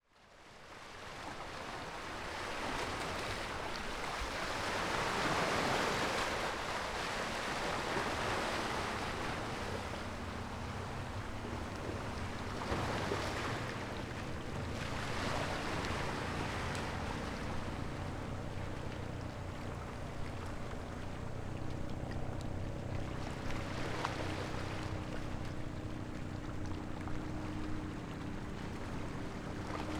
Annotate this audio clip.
Soundscapes > Nature
250814 081232 PH Tingloy island coast atmosphere
Tingloy island coast atmosphere. (take 2) I made this recording in the morning, on the western coast of Tingloy, a small island in Batangas Province, in the Philippines. One can hear gentle waves and wavelets lapping the rocky coast, while fishermen are using small motorboats (outrigger canoes called Bangka) in the distance. At about #4:41, one of these Bangka will pass nearby, while a motorbike passes by gently on the small road in my back. In the background, some cicadas and birds can be heard, as well as some distant voices. Recorded in August 2025 with a Zoom H5studio (built-in XY microphones). Fade in/out applied in Audacity.
ambience, atmosphere, Bangka, birds, boat, boats, cicadas, field-recording, fishermen, fishing, island, morning, motor, motorboat, motorboats, ocean, Philippines, sea, seaside, shore, soundscape, Tingloy, water, wavelets, waves